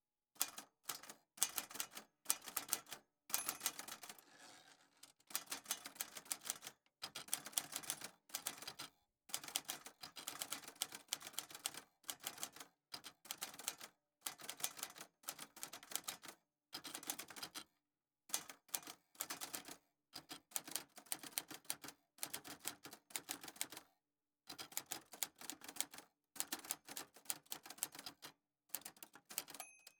Sound effects > Objects / House appliances
Typewriter Typing 04
A foley recording of a vintage typewriter at medium distance to the microphone typing nonstop.
Una grabación de foley de una máquina de escribir vintage a media distancia del micrófono escribiendo sin parar.
Bell, Chaotic, Ding, Foley, Keyboard, Keys, Mechanical, Old, Retro, Typewriter, Typing, Vintage, Writing